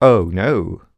Speech > Solo speech
Cocky - Sarcastic Oh noo
no, words, dialogue, Single-take, Male, Vocal, voice, sentence, Tascam, talk, FR-AV2, Neumann, sarcastic, oneshot, NPC, U67, Human, Mid-20s, cocky, smug, Voice-acting, Video-game, singletake, Man